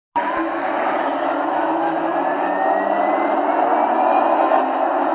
Sound effects > Vehicles
tram accelerating5
Sound of a a tram accelerating from tram stop in Hervanta in December. Captured with the built-in microphone of the OnePlus Nord 4.
traffic, track, tram, field-recording